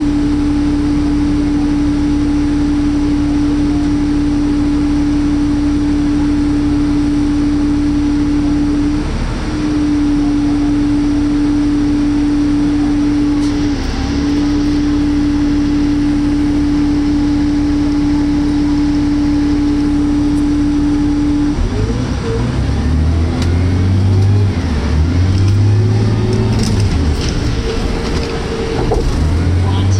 Sound effects > Vehicles
2003 New Flyer D40LF Transit Bus #2 (MiWay 0317)
I recorded the engine and transmission sounds when riding the Mississauga Transit/MiWay buses. This is a recording of a 2003 New Flyer D40LF transit bus, equipped with a Cummins ISL I6 diesel engine and Voith D864.3E 4-speed automatic transmission. This bus was retired from service in 2023.